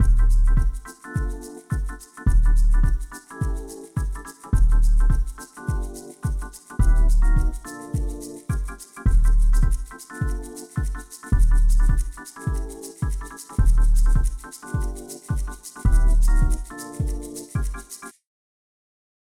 Music > Multiple instruments
106bpm e7 keys and kicks
Some noise I cooked up. Some live instruments recorded through Headrush MX5, and other parts assembled in Logic Pro. Steal any stems. Or if you're trying to make music, hit me up! I'll actually make something of quality if you check out my other stuff.